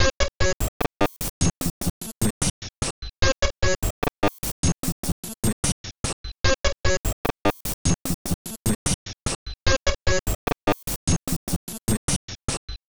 Sound effects > Experimental
This 149bpm Glitch Loop is good for composing Industrial/Electronic/Ambient songs or using as soundtrack to a sci-fi/suspense/horror indie game or short film.
Alien, Ambient, Dark, Drum, Industrial, Loop, Loopable, Packs, Samples, Soundtrack, Underground, Weird